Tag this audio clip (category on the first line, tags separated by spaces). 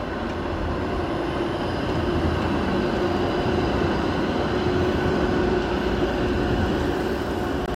Soundscapes > Urban
tram tramway transportation vehicle